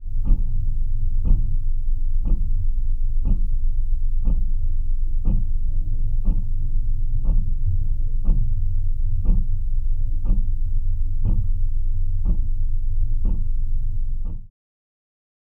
Sound effects > Objects / House appliances
GEOFONE Clock Tick
clock, geophon, tick, ticking